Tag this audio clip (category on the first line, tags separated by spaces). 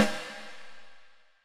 Music > Solo percussion
acoustic beat brass crack drum drumkit drums flam fx hit hits kit ludwig oneshot perc percussion processed realdrum realdrums reverb rim rimshot rimshots roll sfx snare snaredrum snareroll snares